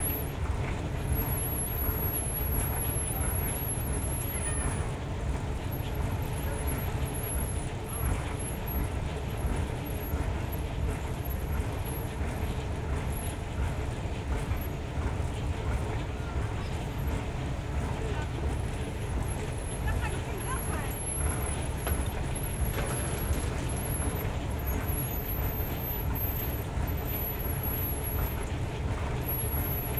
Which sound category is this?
Soundscapes > Urban